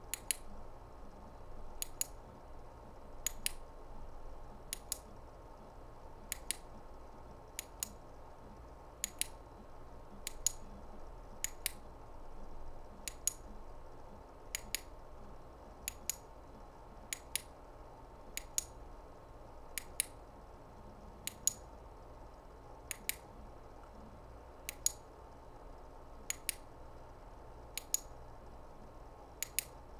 Sound effects > Objects / House appliances
A flashlight clicking on or off.